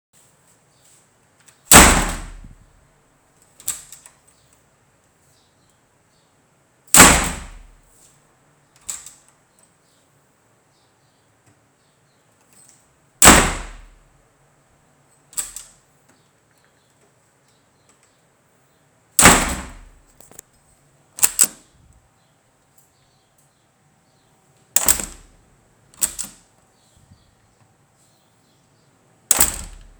Sound effects > Objects / House appliances
PORTA - DOOR - FORTE - HARD - HINGE - DOBRADICA - CHAVE - KEY - TRINCO - BATIDA - HIT - IMPACT - 1
porta - door - forte - hard - hinge - dobradiça - chave - key - trinco - batida - hit - impact
lock, door, close